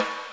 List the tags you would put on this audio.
Music > Solo percussion
roll
percussion
snare
oneshot
ludwig
hit
reverb
sfx
crack
kit
drums
acoustic
drumkit
perc
snares
processed
beat
fx
realdrum
rimshots
hits
rimshot
flam
realdrums
snareroll
snaredrum
brass
drum
rim

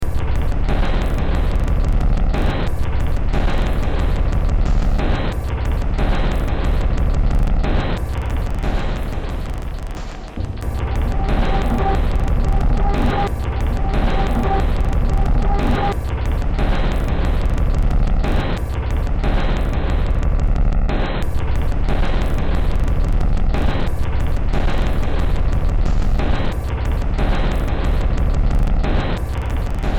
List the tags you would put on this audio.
Music > Multiple instruments
Soundtrack
Ambient
Underground
Games
Horror
Cyberpunk
Sci-fi
Industrial
Noise

